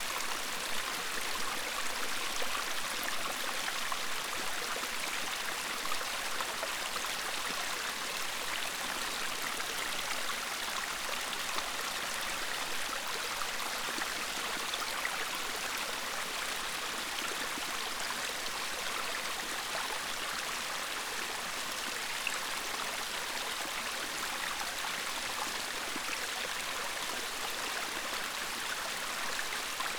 Sound effects > Natural elements and explosions
Forest creek
A small creek of flowing water in the middle of a forest
flowing, river, stream